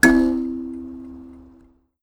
Music > Solo percussion
MUSCTnprc-Blue Snowball Microphone, CU Kalimba, Duo Note Nicholas Judy TDC
A single duo kalimba note.
Blue-brand, tone, kalimba, Blue-Snowball, note